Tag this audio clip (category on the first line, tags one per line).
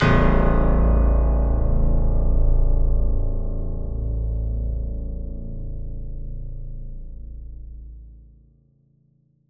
Music > Multiple instruments
cinematic-hit
cinematic-stab
cinematic-sting
dramatic-sting
dylan-kelk
grisly
horror-hit
horror-impact
horror-stab
horror-stings
jumpscare
jumpscare-noise
terrifying
unsettling